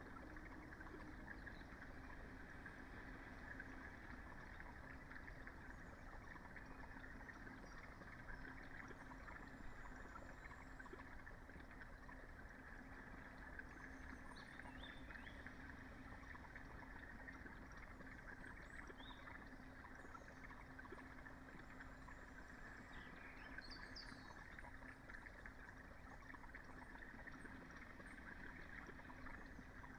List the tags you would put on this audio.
Soundscapes > Nature
nature artistic-intervention raspberry-pi phenological-recording weather-data soundscape field-recording modified-soundscape data-to-sound alice-holt-forest natural-soundscape sound-installation Dendrophone

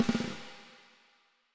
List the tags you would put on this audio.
Music > Solo percussion
flam fx hit ludwig perc reverb snareroll

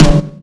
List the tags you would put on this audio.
Instrument samples > Percussion
bassnare percussive Pearl overbassed deepsnare Sonor drum British-Drum-Co fatsnare timpano bassy Canopus Spaun beat drums CC-Drum-Co PDP DW percussion Craviotto Noble-and-Cooley Mapex Tama Yamaha bassized Gretsch bassiest Ludwig bass-snare deep-snare

